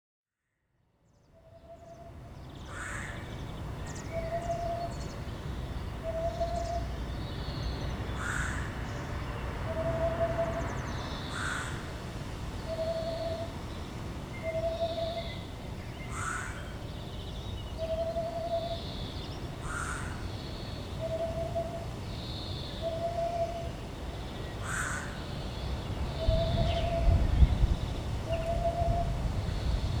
Soundscapes > Urban
An "Ambient Field Recording" very early in the morning. France in Alsace in Saint-Hippolyte. The recording was made on the balcony of the hotel room. Natural sounds and the life of the place can be heard. Occasionally, cars, motorcycles, or trucks can be heard delivering something or passing by. Overall, however, it is a quiet recording. At times, a light wind can be heard. Rode Wireless Go II Stereo Recording, two Omni Wireless Go set 50cm apart on the balcony.
ambience, morning, field-recording, general-noise, nature, ambiance, hum, city, town, alsace, soundscape, atmosphere, birds, urban, car, bird, balcony, rural, france, hotel, background-sound, ambient